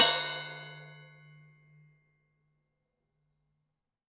Music > Solo instrument
Cymbal Grab Stop Mute-008
Cymbals, Drum, Drums, FX, Metal, Paiste